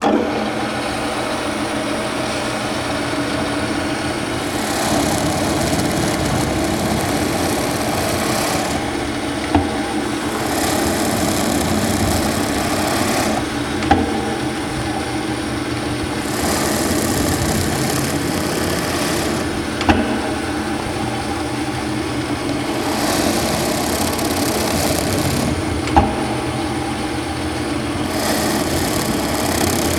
Sound effects > Other mechanisms, engines, machines
Bench drill,wood drilling
Effects recorded from the field.
accurate, bench, carpentry, construction, crafting, drill, drilling, holes, industrial, manufacturing, power, precision, press, stationary, tools, vertical, wood, woodworking, workshop